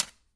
Sound effects > Other mechanisms, engines, machines
metal shop foley -173
sfx, boom, perc, bam, strike, wood, crackle, sound, little, pop, tink, oneshot, bop, metal, percussion, rustle, knock, shop, thud, foley, tools, bang, fx